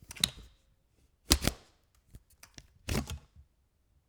Sound effects > Objects / House appliances
250726 - Vacuum cleaner - Philips PowerPro 7000 series - handle and tube sound
7000, aspirateur, FR-AV2, Hypercardioid, Powerpro-7000-series, Sennheiser, Single-mic-mono, Vacum, vacuum-cleaner